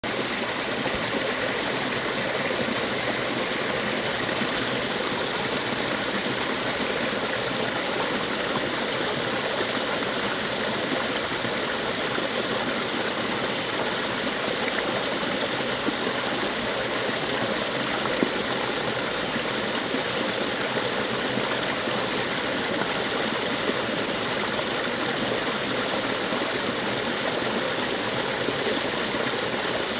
Soundscapes > Nature
Rushing Water
Recorded near a flowing waterfall. Water churning can be heard very clearly and audibly. Recorded on the Samsung Galaxy Z Flip 3. No extra audio processing has been done, aside from any native audio processing done by the phone.
stream, field-recording, nature, water, river, phone-recording, waterfall